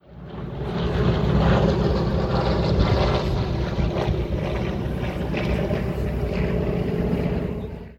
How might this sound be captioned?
Sound effects > Vehicles
AEROMil-CU VF17 Fighter Squadron Pass By Nicholas Judy TDC
A VF-17 fighter squadron passing by. Recorded at the Military Aviation Museum at Virginia Beach in Summer 2021.